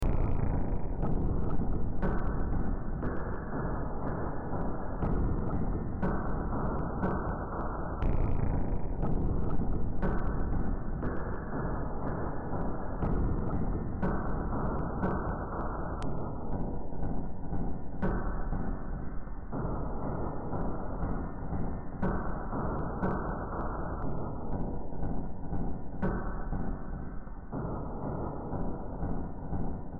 Music > Multiple instruments
Demo Track #3443 (Industraumatic)
Horror, Games, Industrial, Cyberpunk, Ambient, Sci-fi, Noise, Soundtrack, Underground